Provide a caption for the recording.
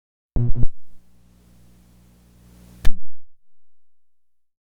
Sound effects > Objects / House appliances
Tape Deck StartNStop
Real Authentic Cassette Tape "Play" and the "Stop" button cuts tape signal off
analog, buzz, Cassette, electric, lo-fi, machine, noise, static, tape